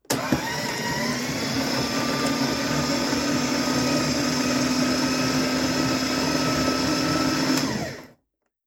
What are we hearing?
Sound effects > Objects / House appliances
MACHOffc-Samsung Galaxy Smartphone, CU Paper Shredder, Start, Reverse, Stop Nicholas Judy TDC
A Fellowes paper shredder starting, reversing and stopping.
Phone-recording, reverse